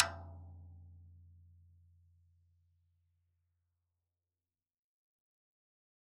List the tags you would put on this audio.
Music > Solo percussion
drumkit
tom
studio
floortom
oneshot
drum
rim
drums
flam
roll
beatloop
perc
fill
acoustic
tomdrum
instrument
percs
velocity
kit
beat
beats
toms
percussion
rimshot

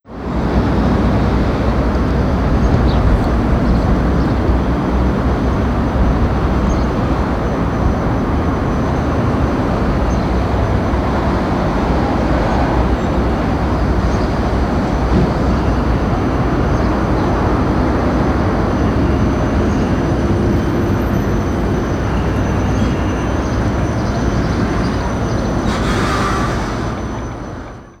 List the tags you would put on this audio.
Soundscapes > Urban
carousel
metal
traffic
workshop

